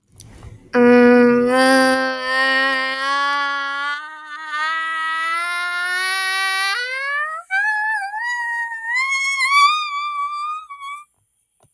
Speech > Solo speech
Girl singing Used Windows Lenovo Ideapad to record 100% natural no artifical intelligence
singing, speech, girl